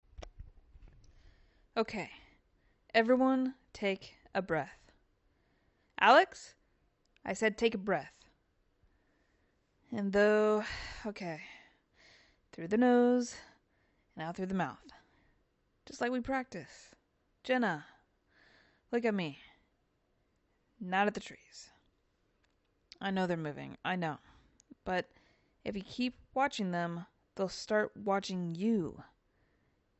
Speech > Solo speech
“We Shouldn’t Be Here” (supernatural suspense / emotional urgency / immersive cast feel)
A longer, tension-heavy scene with multiple characters and immersive delivery. Ideal for storytelling performance, acting reels, or cinematic sound design. Script: Okay. Everyone take a breath. …Alex, I said breath. In through the nose, out through the mouth. Just like we practiced. Jenna—look at me. Not at the trees. I know they’re moving. I know. But if you keep watching them, they’ll start watching you. Mason—how’s Bailey? Still breathing? Good. Keep pressure on that. We’re not losing her. We shouldn’t be here. I don’t care what the map says. I don’t care that it looked normal on the satellite view. This place wasn’t here yesterday. No birds. No bugs. Just… that sound. That low hum under everything. And that thing we saw by the creek? That wasn’t a person. Look—sun’s almost gone. We’ve got maybe twenty minutes to get back to the ridge. After that… I don’t think we’ll get out. So no more arguing. No more photos. No more splitting up. Everyone stay close.
suspense, script, supernaturalstory, ministory